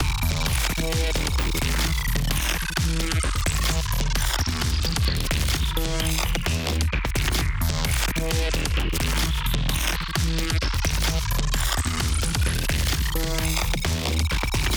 Music > Other
Glitch Loop 05
A designed glitch loop created in Reaper with a bunch of VST's.
electronic, Glitch, loop